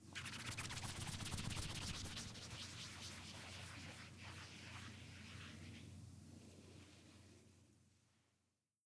Sound effects > Other
Swish, Spinning, Fast to Slow, on Fabric
Foley for an object spinning to a stop on a tablecloth; could also be used as emotion sfx for any spinning thing starting fast and slowing to a stop.
air, fabric, material, slowing, spin, twirl